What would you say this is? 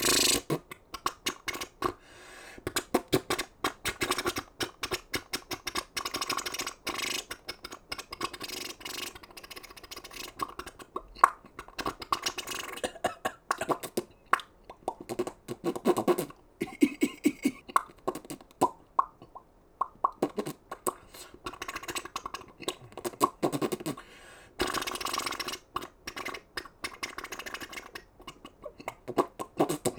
Sound effects > Vehicles
TOONVeh-Blue Snowball Microphone, CU Jalopy, Comedic Nicholas Judy TDC
A comedic jalopy.
Blue-brand, Blue-Snowball, cartoon, comedic, jalopy